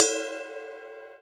Instrument samples > Percussion
A good brief/shortlengthed ride.
Meinl, metal, sinocymbal, low-pitched, smash, clash, metallic, ride, Istanbul, sinocrash, Zultan, Soultone, Zildjian, cymbal, crash, Sabian, multicrash, clang, bang, Paiste, China, polycrash, crack, Stagg, multi-China, spock, crunch, Avedis